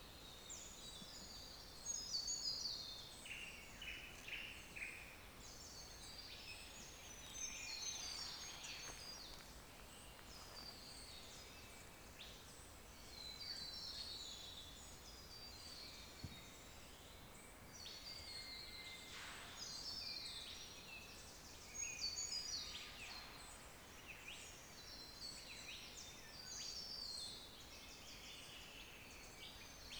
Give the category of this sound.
Soundscapes > Nature